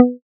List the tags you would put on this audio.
Instrument samples > Synths / Electronic
pluck
fm-synthesis
additive-synthesis

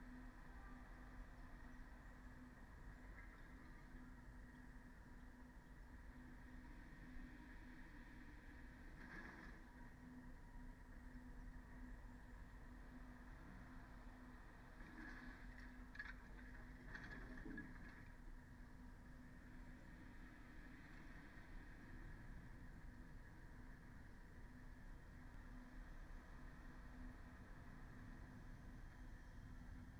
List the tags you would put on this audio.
Soundscapes > Nature
phenological-recording
Dendrophone
artistic-intervention
alice-holt-forest
data-to-sound
natural-soundscape
field-recording
sound-installation

